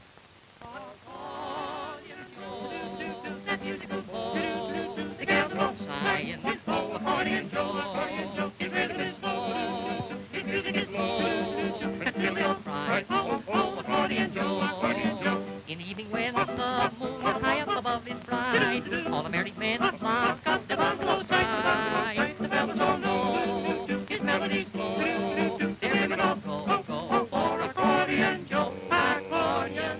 Music > Multiple instruments
Begin Music Of Accordian Joe
Begin music of Fleischer Studios cartoon Accordian Joe. Great example of 1930s US music style.